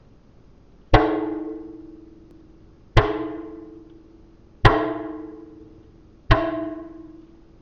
Sound effects > Other
banging sound
I had an empty soda can on my desk so I held it by the tab and hit it with a ruler I just printed from my 3d printer, I added some filters and this is the result.
metallic, percussive, banging, drum